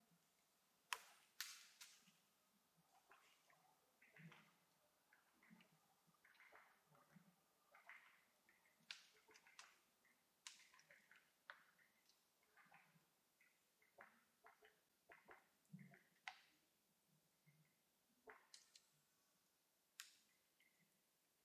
Sound effects > Animals
Woodpecker pecking bird